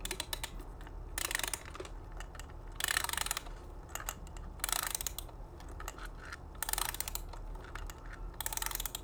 Sound effects > Objects / House appliances
MECHRtch-Blue Snowball Microphone, CU Jewelry Box, Music, Wind Up Nicholas Judy TDC
A musical jewelry box winding up.